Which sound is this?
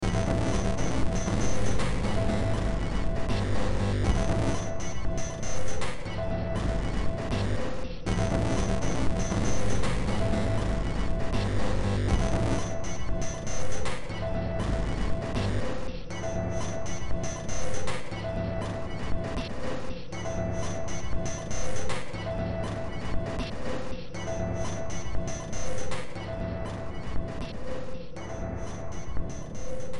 Music > Multiple instruments
Demo Track #3858 (Industraumatic)
Horror, Cyberpunk, Sci-fi, Soundtrack, Underground, Industrial, Ambient, Games, Noise